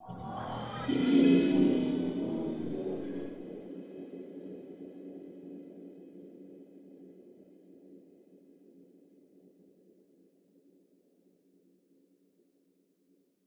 Sound effects > Experimental
Creature Monster Alien Vocal FX-34
Groan, Sound, Creature, Fantasy, scary, Growl, Deep, Alien, evil, Vox, gutteral, demon, Sounddesign, Ominous, Snarl, visceral, Otherworldly, Animal, Vocal, Snarling, Reverberating, devil, gamedesign, Echo, sfx, Frightening, Monster, fx, Monstrous, boss